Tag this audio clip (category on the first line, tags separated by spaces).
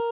Instrument samples > String
arpeggio guitar sound